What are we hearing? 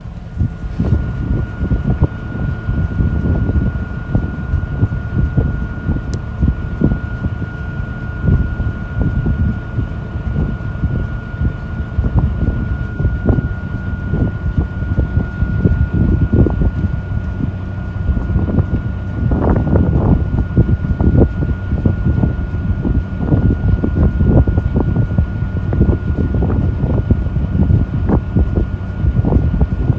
Sound effects > Vehicles
Chappy Ferry Ride
The Chappy Ferry taking passengers and vehicles across the bay from Edgartown on Martha's Vineyard over to Chappaquidick island. The same (or similar) ferry that is seen in the classic 1975 film "Jaws".
island, motor